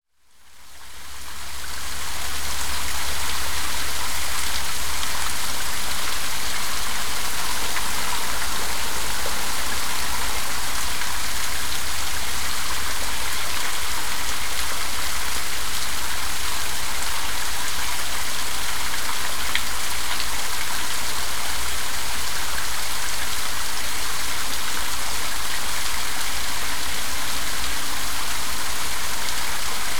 Soundscapes > Nature
A recording of water in a pond passing through a large drain. Tascam. Stereo.